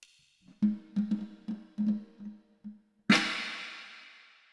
Music > Solo percussion
snare Processed - foley tuning - 14 by 6.5 inch Brass Ludwig
snare, brass, reverb, processed, snareroll, realdrum, rimshots, percussion, snaredrum, drumkit, fx, rimshot, realdrums, acoustic, drum, crack, snares, sfx, hits, beat, kit, flam, rim, roll, perc, drums, hit, oneshot, ludwig